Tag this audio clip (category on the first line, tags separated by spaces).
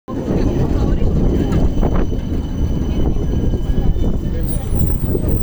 Sound effects > Vehicles
tram rail vehicle